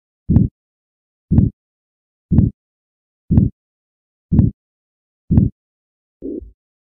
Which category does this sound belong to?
Sound effects > Human sounds and actions